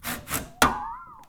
Sound effects > Other mechanisms, engines, machines
Handsaw Multi Hit Foley 1
foley, fx, handsaw, hit, household, metal, metallic, perc, percussion, plank, saw, sfx, shop, smack, tool, twang, vibe, vibration